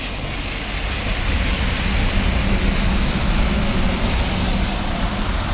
Sound effects > Vehicles
A bus passing by in Tampere, Finland. Recorded with OnePlus Nord 4.